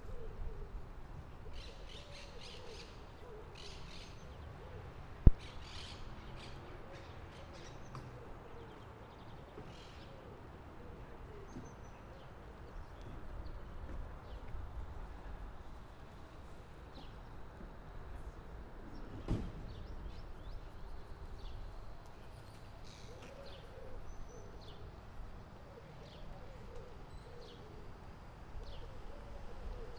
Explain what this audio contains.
Soundscapes > Urban
20250312 JardinsMontbauBusStop Birds Cars Calm
Montbau, Calm, Birds, Jardins, Bus, Stop, Cars